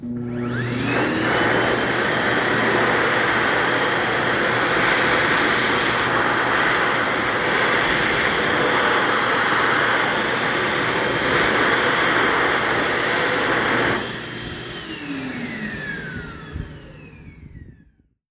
Sound effects > Objects / House appliances
MACHAppl-Samsung Galaxy Smartphone, CU Xlerator Excel Dryer, Dry Off Hands Nicholas Judy TDC
An Xlerator excel dryer drying off hands. Recorded at Target.
wind, Phone-recording, hands, fan, hand-dryer, dry-off